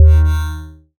Instrument samples > Synths / Electronic
BUZZBASS 1 Eb
additive-synthesis, bass, fm-synthesis